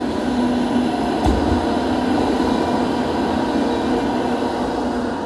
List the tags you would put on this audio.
Sound effects > Vehicles
Tampere
tram
vehicle

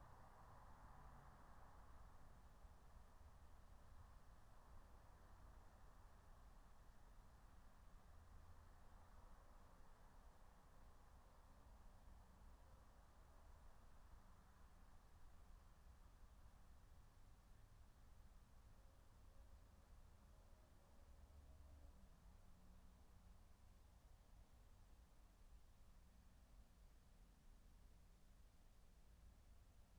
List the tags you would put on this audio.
Soundscapes > Nature
soundscape
alice-holt-forest
meadow
natural-soundscape
raspberry-pi
nature
field-recording
phenological-recording

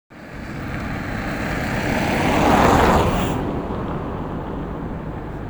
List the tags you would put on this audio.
Sound effects > Vehicles
car
traffic
vehicle

